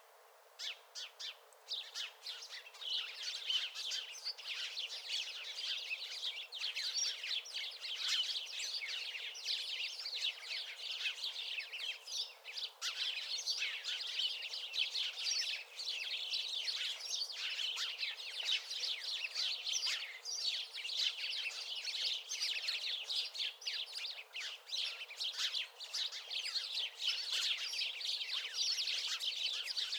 Sound effects > Animals
birds sparrows 2
Sparrow bird singing
nature, birds, nuthatch